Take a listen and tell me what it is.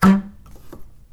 Sound effects > Other mechanisms, engines, machines
Woodshop Foley-041
bam, bang, boom, bop, crackle, foley, fx, knock, metal, oneshot, perc, percussion, pop, sfx, shop, sound, strike, thud, tink, tools, wood